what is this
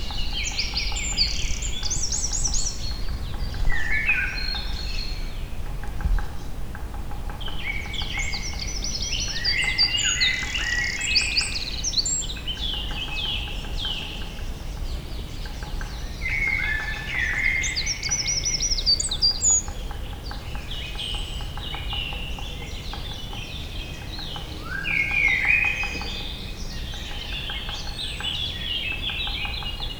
Soundscapes > Nature
250418 11h59 Gergueil West forest Wood Pecking bird
Subject : Heard this sound in the forest, I was wondering if it was someone out there hamering something or whatnot. It surly was a bird Date YMD : 2025 04 18 11h59 Location : Gergueil France. Hardware : Tascam FR-AV2, Rode NT5 ORTF Weather : Half clear half cloudy, mostly on the cloudy side. Processing : Trimmed and Normalized in Audacity. Probably some fade in/out.
Tascam, field, FR-AV2, cote-dor, country-side, countryside, ORTF, bugs, rural, france, Bird